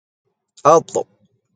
Sound effects > Other
tho-sisme
voice, male, arabic, vocal